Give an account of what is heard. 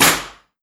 Sound effects > Other mechanisms, engines, machines
TOOLPneu-Samsung Galaxy Smartphone Nail Gun, Burst 03 Nicholas Judy TDC
A nail gun burst. Also works for a balloon popping or a gun shot element.
gunshot, brust, element